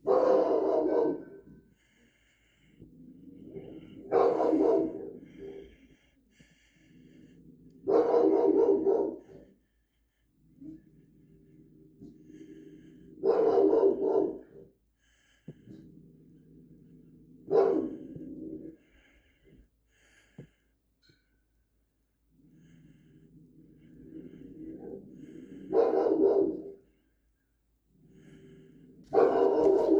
Sound effects > Animals
ANMLDog-Samsung Galaxy Smartphone, CU Aggessive Dog Barks, Snarls, Inside Window Nicholas Judy TDC
Aggressive dog barks and snarls outside a window at interior perspective. Performed by Brionna's dog, Avery.